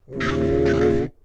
Sound effects > Other
combo holy dark
40 - Combined Holy and Dark Spells Sounds foleyed with a H6 Zoom Recorder, edited in ProTools together
combination, holy, spell, dark